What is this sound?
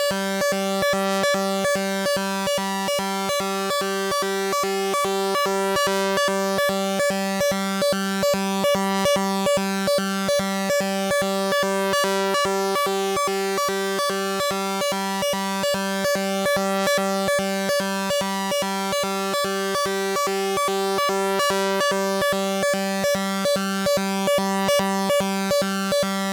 Sound effects > Electronic / Design
Just easily FM a saw wave with a square wave. Synthsiser just phaseplant.